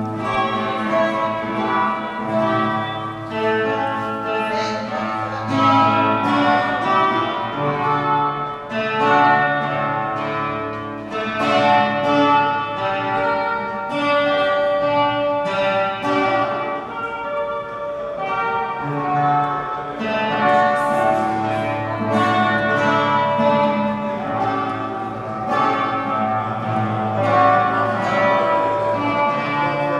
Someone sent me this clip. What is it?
Soundscapes > Urban
20251024 MetroEspanya Guitar Humans Nice
Guitar, Humans, Nice